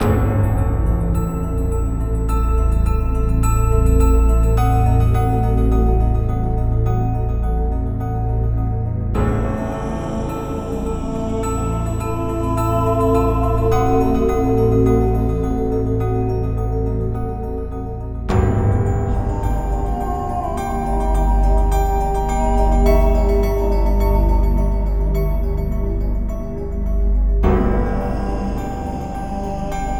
Music > Multiple instruments
Horror Texture (Witchwood) #2
spooky, spooky-music, thrill, horror-loop, 105-bpm-loop, spooky-ambience, creepy, sinister, horror-beat, frightening, horror-texture, horror-music, 16-bar-loop, silent-hill, 16-bar, 105-bpm, horror-ambience, creepy-texture, goosebumps, 16-bar-bpm-loop, horror, horror-music-loop, creepy-music